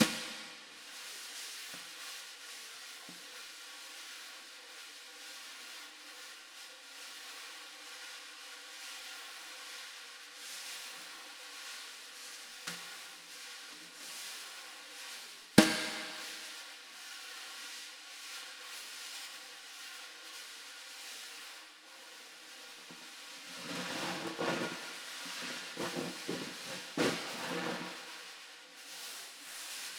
Music > Solo percussion
snare Processed - brush fx - 14 by 6.5 inch Brass Ludwig
beat, snares, percussion, kit, flam, hit, snaredrum, realdrums, snare, rimshots, rim, rimshot, acoustic, crack, reverb, hits, drum, processed, roll, fx, drums, drumkit, oneshot, realdrum, perc, snareroll, brass, ludwig, sfx